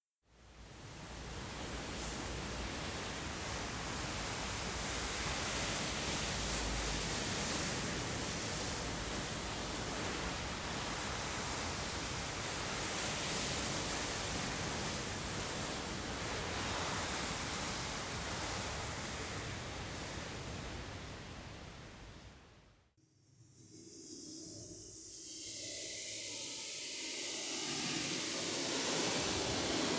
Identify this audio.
Soundscapes > Nature
The wind-blown desert sand flows downward. No birds, no animals, just the sand flowing endlessly, as if it never stops until the dunes reach the sea. It's very soothing. It sounds like rolling grains or cereals. You can easily make a loop with this track. * No background noise. * No reverb nor echo. * Clean sound, close range. Recorded with Iphone or Thomann micro t.bone SC 420.